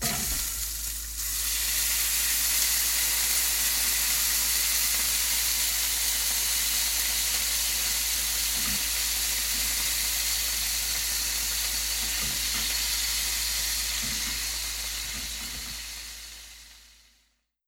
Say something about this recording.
Sound effects > Objects / House appliances
FOODCook-Samsung Galaxy Smartphone Meat, Dousing with Sizzles Nicholas Judy TDC
Dousing meat with sizzles.
douse, meat, sizzle